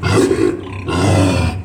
Sound effects > Animals
Swine - Pig; Dinosaur-like Grunt
Recorded with an LG Stylus 2022, this is the sound of a pig making a growl-like grunting noise, which can be used for dinosaurs, monsters, kaijus, or any large- to medium-sized growling creature of choice.
barn, barnyard, boar, dinosaur, farm, growl, grunt, hog, livestock, monster, oink, pig, snort, swine